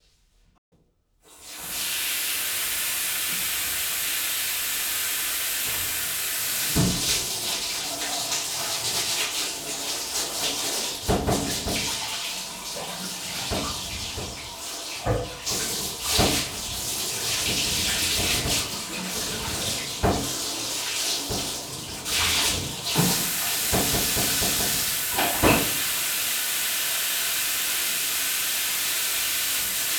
Soundscapes > Indoors
You can hear someone running a bath, that is, filling a bathtub with water. Microphones were placed in the hallway outside the bathroom to capture more ambient sound, and only one microphone was placed directly in the bathroom. This is the Single Track from the Mic that was outside the Bathroom in the floor, to generate more "Room-Tone". However there are the other single tracks and a Mixed-Version although available.